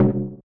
Instrument samples > Percussion
Native Percussions 1 Mid2
Hi ! That's not recording sound :) I synth it with phasephant!
Bongo, Conga, drum, Enthnic, Native, Percussion